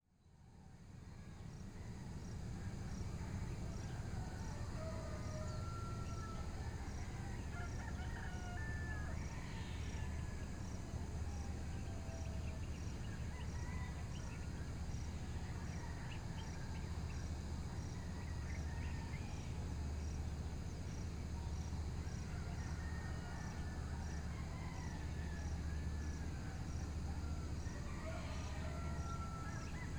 Soundscapes > Urban
250824 052506 PH Dawn chorus and sunrise in Filipino suburb
Dawn chorus and sunrise in a Filipino suburbs. I made this recording at about 5:30AM, from the terrace of a house located at Santa Monica Heights, which is a costal residential area near Calapan city (oriental Mindoro, Philippines). One can hear the atmosphere of this place during sunrise, with some crickets, dawn chorus from local birds, roosters in the distance, dogs barking, cicadas waking up, some human voices and activities, some vehicles passing by, as well as some distant fishermen’s motorboats and traffic. At #29:56, the bell of the nearby church starts ringing. Recorded in August 2025 with a Zoom H6essential (built-in XY microphones). Fade in/out applied in Audacity.